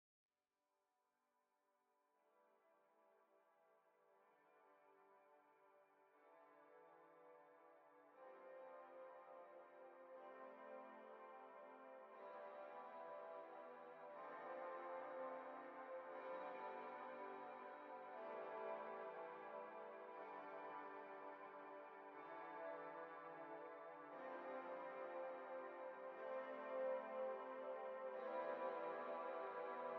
Music > Solo instrument
Piano melodic ambient
somewhat musical ambience i've made a few days ago, decided to upload it here cause i have nothing else to do lol can be used for games made in fl studio 2024
ambiance, ambience, atmosphere, background-sound, muse, soundscape